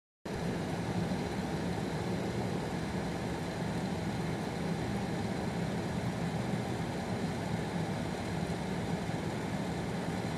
Sound effects > Objects / House appliances
A recording of my air conditioner in my room at night, can be looped